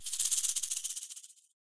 Instrument samples > Percussion
Dual shaker-006
sampling, percusive, recording